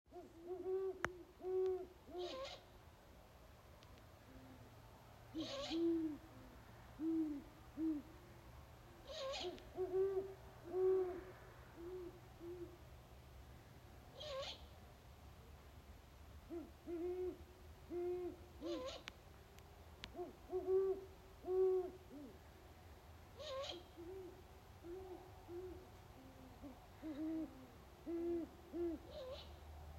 Soundscapes > Nature
Owls multiple 08/04/2023
Sound of owls next door
great-horn-owls, owls, birds